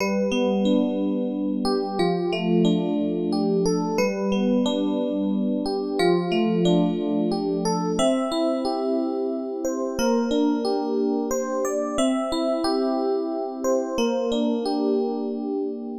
Music > Solo instrument
The legendary Yamaha DX7 Digital Piano. A nice gamer type melody I made on my Yamaha Modx.